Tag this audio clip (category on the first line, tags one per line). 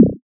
Instrument samples > Synths / Electronic
bass
fm-synthesis